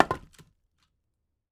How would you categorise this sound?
Sound effects > Natural elements and explosions